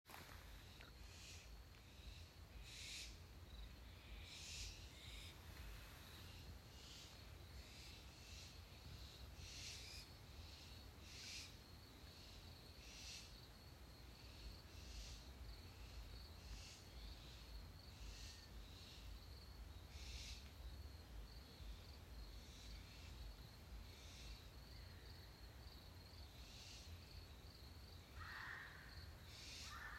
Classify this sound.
Soundscapes > Nature